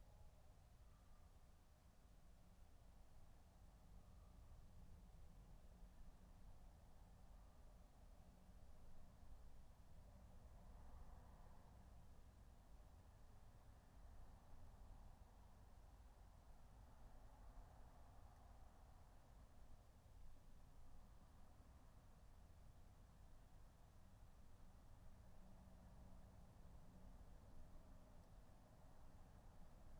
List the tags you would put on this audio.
Soundscapes > Nature
field-recording meadow

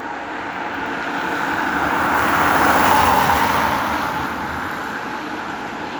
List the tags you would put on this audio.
Soundscapes > Urban
field-recording Drive-by Car

